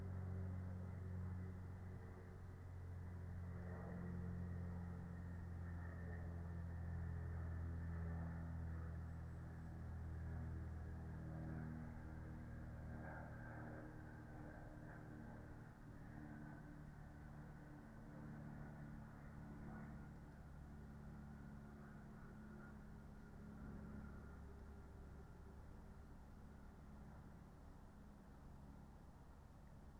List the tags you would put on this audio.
Soundscapes > Nature
alice-holt-forest data-to-sound Dendrophone natural-soundscape nature phenological-recording raspberry-pi sound-installation soundscape weather-data

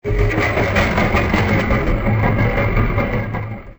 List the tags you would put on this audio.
Sound effects > Animals
Creature Snarl Animal Growl Crocodile Alligator Big Monster Large

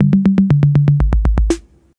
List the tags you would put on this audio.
Music > Solo percussion
606 DrumMachine Analog Bass Drum music Kit Vintage Synth Electronic

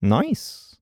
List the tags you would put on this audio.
Speech > Solo speech
2025 Adult Calm FR-AV2 Generic-lines Hypercardioid july Male mid-20s MKE-600 MKE600 nice Sennheiser Shotgun-mic Shotgun-microphone Single-mic-mono Tascam VA Voice-acting

